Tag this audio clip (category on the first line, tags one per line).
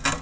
Sound effects > Other mechanisms, engines, machines
percussion
sfx
saw
plank
vibration
metallic
metal
perc
smack
tool
fx
foley
vibe
twang
shop
household
hit
handsaw
twangy